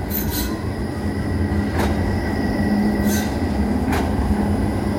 Sound effects > Vehicles
Tram driving near station at low speed in Tampere. Recorded with iphone in fall, humid weather.